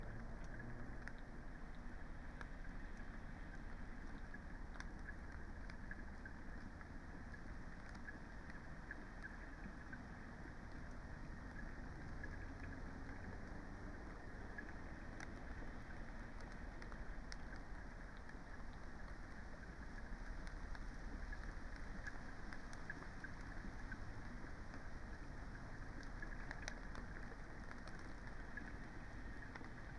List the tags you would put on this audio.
Soundscapes > Nature
modified-soundscape
weather-data
Dendrophone
phenological-recording
sound-installation

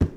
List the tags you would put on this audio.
Sound effects > Objects / House appliances
plastic
knock
slam
garden
cleaning
shake
clatter
clang
water
scoop
handle
pail
foley
liquid
debris
fill
household
tool
container
lid
carry
pour
drop
object
hollow
kitchen
bucket
tip
spill
metal

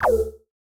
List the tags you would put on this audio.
Sound effects > Electronic / Design
SHARP
OBSCURE
CIRCUIT
UNIQUE
BOOP
COMPUTER
INNOVATIVE
HIT
DING
ELECTRONIC

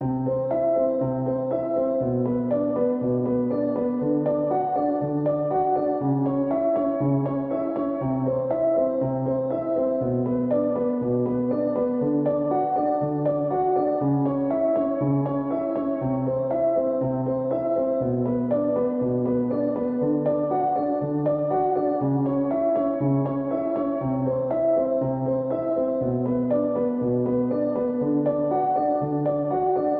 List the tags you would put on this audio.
Music > Solo instrument
120
120bpm
free
loop
music
piano
pianomusic
reverb
samples
simple
simplesamples

